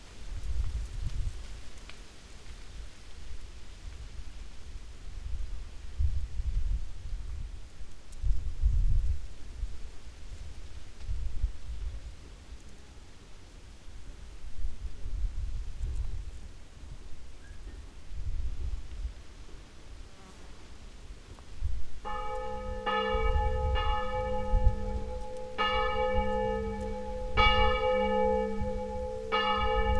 Soundscapes > Urban
250830 11h59 Gergueil Bells from West
Subject : Recording the church bells Date YMD : 2025 August 30 Around 11h59 Location : Gergueil 21410 Bourgogne-Franche-Comté Côte-d'Or France. Sennheiser MKE600 with stock windcover. P48, no filter. A manfroto monopod was used. Weather : A little windy more than the wind-cover could handle. Processing : Trimmed and normalised in Audacity. Notes : I had my back to the south trying to use my body as a windshield. My grandmother on her death-bed, a bit confused wanted me to record the bells facing to the east as the bells "project to the est". I obliged so headed west, to record to the east.
Cote-dor, country-side, Tascam, mke600, France, FR-AV2, Hypercardioid, bell, rural, ring, 21410, Gergueil, mke-600, church, field-recording, ambience, shotgun-mic, Bells